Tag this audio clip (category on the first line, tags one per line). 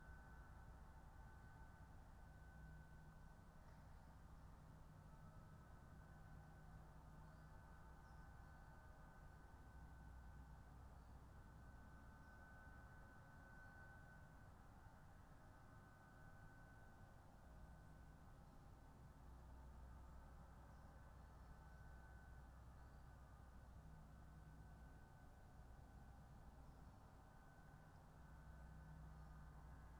Soundscapes > Nature
soundscape
alice-holt-forest
data-to-sound
weather-data
Dendrophone
nature
artistic-intervention
field-recording
modified-soundscape
natural-soundscape
phenological-recording
raspberry-pi
sound-installation